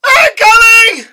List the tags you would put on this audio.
Speech > Solo speech
voice war scream vocal male battle

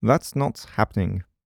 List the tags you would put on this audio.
Speech > Solo speech
2025 Adult Generic-lines Hypercardioid mid-20s Single-mic-mono Tascam VA

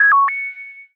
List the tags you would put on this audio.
Sound effects > Electronic / Design
sfx effect bleep blip sine digital game processed gui chirp ringtone electronic ui computer click synth beep

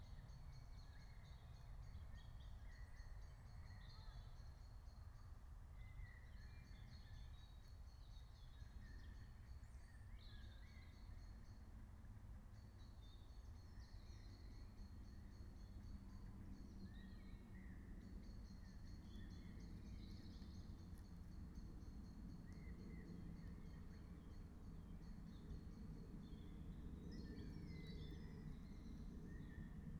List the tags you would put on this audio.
Soundscapes > Nature
nature natural-soundscape meadow field-recording raspberry-pi soundscape phenological-recording alice-holt-forest

